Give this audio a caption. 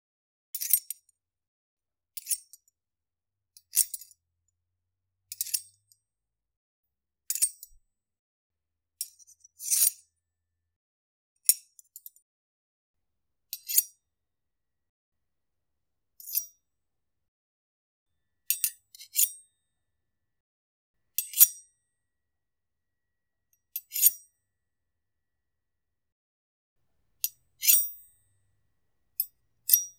Sound effects > Objects / House appliances
knife slide true assassin 01162026
true assassin knife slide and handling sound with ringouts recorded from silverware spoon and fork handling and sliding one another. (mainly fork and spoon.)